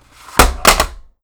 Sound effects > Objects / House appliances
FOLYProp-Blue Snowball Microphone VHS Tape, Drop, Out of Cardboard Case Nicholas Judy TDC
A VHS tape dropping out of a cardboard case.
Blue-brand, Blue-Snowball, cardboard, case, drop, foley, out, tape, vhs